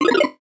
Sound effects > Electronic / Design
Synthy Error
A delightful lil chime/ringtone, made on a Korg Microkorg S, edited and processed in Pro Tools.
game, computer